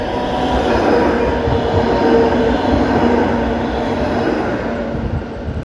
Soundscapes > Urban
ratikka6 copy
tram, vehicle